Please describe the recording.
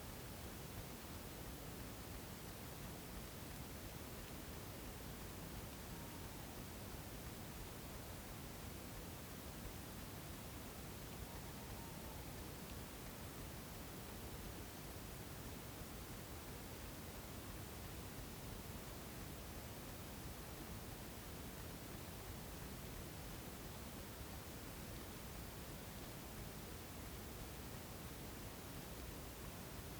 Soundscapes > Nature
20250419 00h00-03h00 - Gergueil forest chemin de boeuf
2025, ambience, April, birds, Bourgogne-Franche-Comte, Cote-dor, country-side, field-recording, forret, France, Gergueil, H2N, nature, spring, windless, Zoom-H2N